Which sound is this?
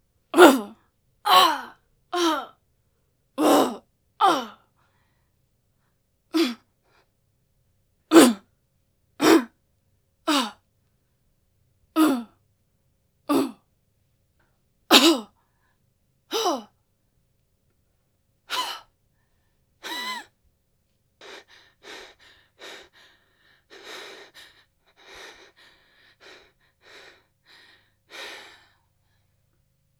Sound effects > Human sounds and actions
Henchman #7 Fight Vocalizations
Send us what you use it in! We'd love to see your work. Check it out here!
combat, enemy, fighting, gasp, goon, Henchman, punch, thug